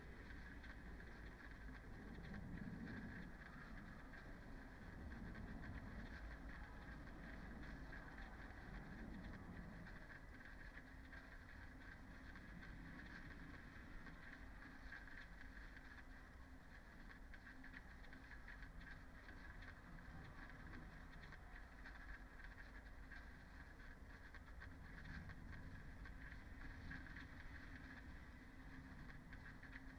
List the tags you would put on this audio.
Soundscapes > Nature
phenological-recording raspberry-pi nature artistic-intervention soundscape modified-soundscape weather-data sound-installation data-to-sound field-recording alice-holt-forest Dendrophone natural-soundscape

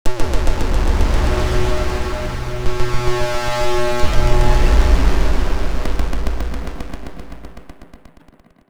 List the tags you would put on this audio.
Sound effects > Electronic / Design
Alien Analog Bass Digital DIY Dub Electro Electronic Experimental FX Glitch Glitchy Handmadeelectronic Infiltrator Instrument Noise noisey Optical Otherworldly Robot Robotic Sci-fi Scifi SFX Spacey Sweep Synth Theremin Theremins Trippy

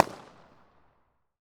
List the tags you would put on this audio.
Sound effects > Natural elements and explosions
32 32float Balloon Data float High Impulse IR Measuring Pop Quality Response

